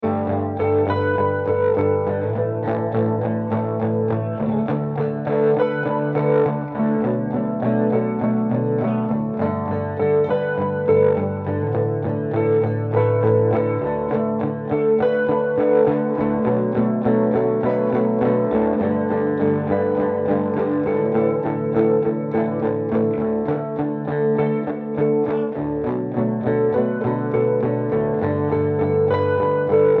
Music > Solo instrument
Roughly 102bpm, mellow rock guitar tune. Made in Logic, recorded with a UA interface and Fender guitar. Lots of reverb, no drum track, swirling and looping mellow tune. The no drums makes it good to sample from. Reminds me of something from the 2000s.